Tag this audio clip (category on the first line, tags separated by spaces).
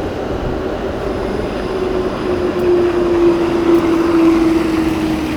Sound effects > Vehicles
tram vehicle transport